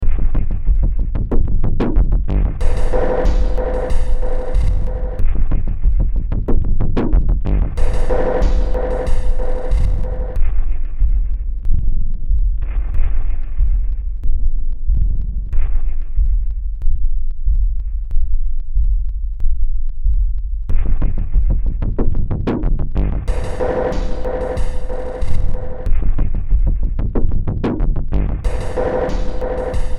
Music > Multiple instruments
Demo Track #2977 (Industraumatic)
Industrial, Ambient, Soundtrack, Games, Sci-fi, Underground, Noise, Horror, Cyberpunk